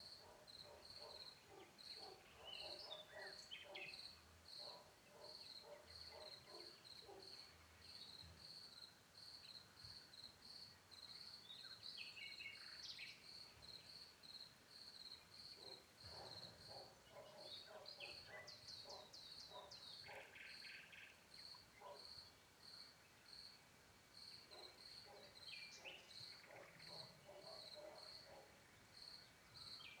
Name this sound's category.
Soundscapes > Nature